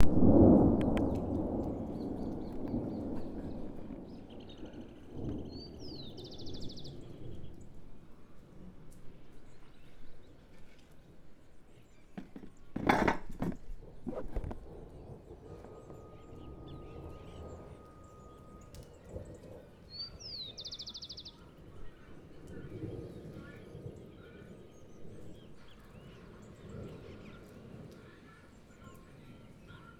Sound effects > Natural elements and explosions
thunder storm / tomenta de truenos electrica
tormenta electrica de dia en san javier. grabado con roland r26. Recorded with a Roland R26.
tormenta, argentina, storm, tormenta-electrica, thunder, field-recording, truenos, cordoba, nature